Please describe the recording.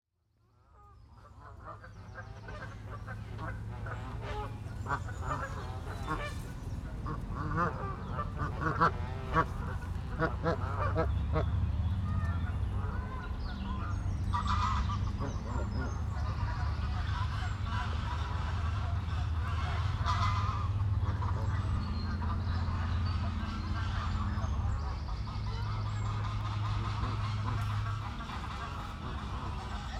Soundscapes > Nature
A recording at Wolseley nature Reserve.